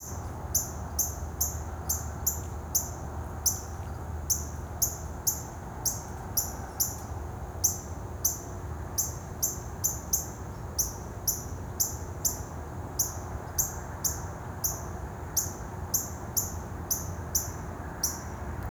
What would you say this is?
Soundscapes > Nature
Bird Chirps
I don't know what kind of bird this is, but it's outside my Ann Arbor apartment.